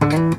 Music > Solo instrument
Acoustic Guitar Oneshot Slice 76
acoustic, chord, foley, fx, guitar, knock, note, notes, oneshot, pluck, plucked, sfx, string, strings, twang